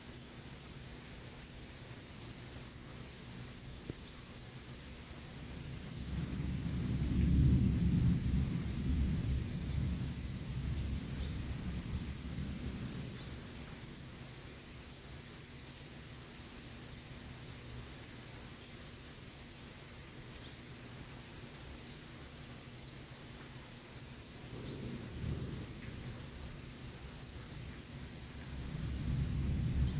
Soundscapes > Nature
STORM-Samsung Galaxy Smartphone, MCU Distant Rainshower, Thunder Rumbles Nicholas Judy TDC
A distant rainshower and loud thunder rumbles.